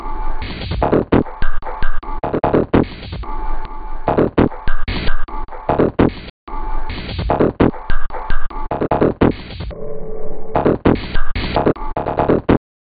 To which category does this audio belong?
Instrument samples > Percussion